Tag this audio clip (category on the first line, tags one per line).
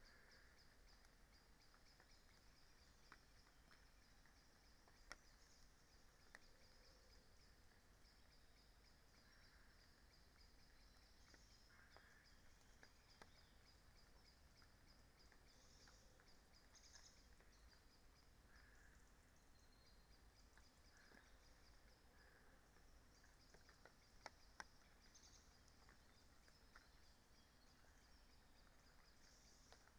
Soundscapes > Nature
modified-soundscape
sound-installation
nature
data-to-sound
phenological-recording
natural-soundscape
Dendrophone
alice-holt-forest
raspberry-pi
field-recording
artistic-intervention
weather-data
soundscape